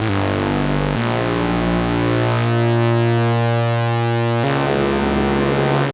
Sound effects > Experimental
Analog Bass, Sweeps, and FX-100
vintage, oneshot, basses, pad, fx, retro, sfx, machine, effect, electronic, analogue, synth, trippy, sci-fi, bassy, alien, snythesizer, scifi, mechanical, weird, bass, analog, dark, robotic, complex, sweep, korg, robot, sample, electro